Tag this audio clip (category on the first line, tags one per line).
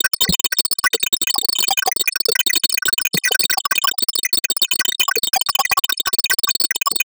Sound effects > Electronic / Design
Glitch
Effect
FX